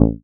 Instrument samples > Synths / Electronic
MEOWBASS 4 Db
additive-synthesis, bass, fm-synthesis